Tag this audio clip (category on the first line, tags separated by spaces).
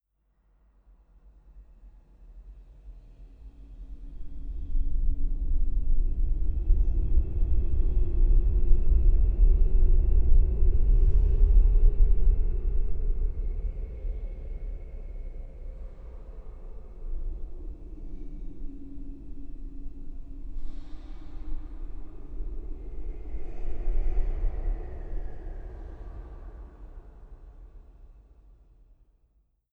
Soundscapes > Other
tunnel Wind Windy